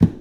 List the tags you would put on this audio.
Sound effects > Objects / House appliances
spill
shake
container
debris
tip
clatter
bucket
plastic
lid
object
liquid
fill
clang
pail
drop
metal
slam
foley
scoop
cleaning
tool
carry

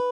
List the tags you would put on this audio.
Instrument samples > String
cheap
arpeggio
design
tone
sound
guitar
stratocaster

